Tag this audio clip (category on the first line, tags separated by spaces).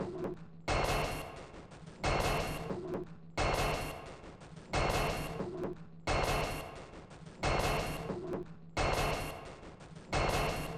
Instrument samples > Percussion
Loopable Soundtrack Drum Loop Dark Underground Alien Industrial Samples Weird Ambient Packs